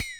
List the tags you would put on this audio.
Instrument samples > Percussion
1lovewav
perc

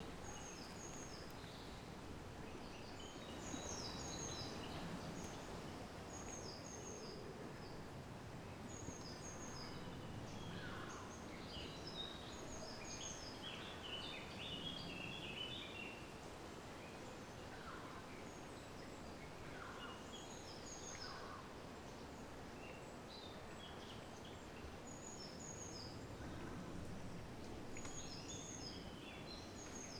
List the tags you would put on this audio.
Soundscapes > Nature
Birdsong
field-recording
forest
leaves
nature
Trees
Woodland

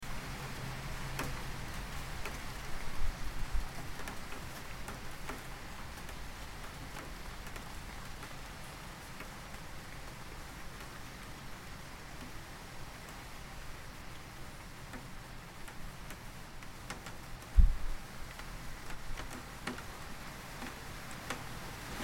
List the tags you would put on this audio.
Sound effects > Other
raindrops
raining

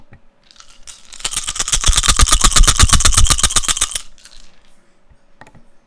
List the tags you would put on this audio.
Sound effects > Other
Shaking
Rattling
Object

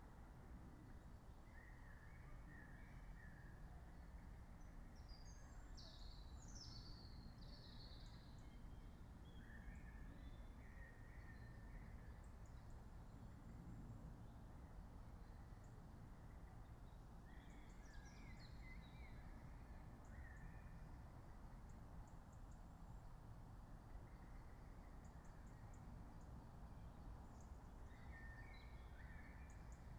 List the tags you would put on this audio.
Soundscapes > Nature
raspberry-pi
Dendrophone
modified-soundscape
artistic-intervention
field-recording
data-to-sound
sound-installation
alice-holt-forest
natural-soundscape

